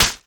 Sound effects > Electronic / Design
Matchstrike Fail-01
Matchstick being struck against a matchbox or rough surface unsuccessfully. Variation 1 of 4.
attempt, light, scratch, fail, strike, scrape, matchstick